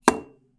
Sound effects > Objects / House appliances
Glass place
Clear sound of a glass being placed on a hard surface. This is a demo from the full "Apartment Foley Sound Pack Vol. 1", which contains 60 core sounds and over 300 variations. Perfect for any project genre.
contact, surface, glass, place, object